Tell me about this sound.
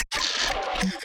Sound effects > Experimental
Gritch Glitch snippets FX PERKZ-002
abstract, alien, clap, crack, edm, experimental, fx, glitch, glitchy, hiphop, idm, impact, impacts, laser, lazer, otherworldy, perc, percussion, pop, sfx, snap, whizz, zap